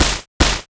Instrument samples > Percussion
[CAF8bitV2]8-bit Snare1-C Key-Dry&Wet
Snare, Game, 8bit, 8-bit